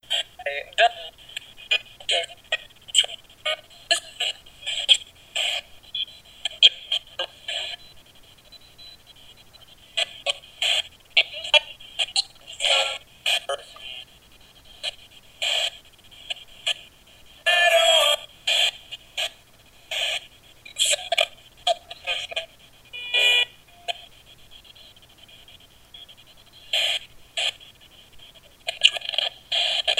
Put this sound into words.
Sound effects > Objects / House appliances
COMRadio-Blue Snowball Microphone, MCU Hand Turbine, Tuning, FM Band Nicholas Judy TDC
A hand turbine radio tuning. FM band.
band, Blue-brand, Blue-Snowball, fm, fm-band, hand, radio, tuning, turbine